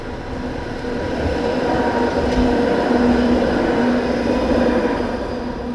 Sound effects > Vehicles
Sound recording of a tram passing by. Recording done in Hallilan-raitti, Hervanta, Finland near the tram line. Sound recorded with OnePlus 13 phone. Sound was recorded to be used as data for a binary sound classifier (classifying between a tram and a car).